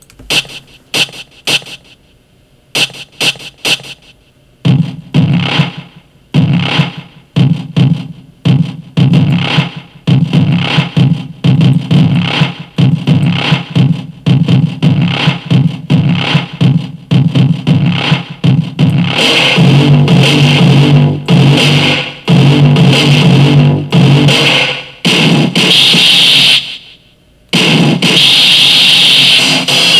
Soundscapes > Synthetic / Artificial
A freestyle symphony